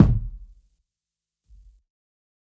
Instrument samples > Percussion
Kick - medium
Heavily processed, lo-fi, crunchy drum sample.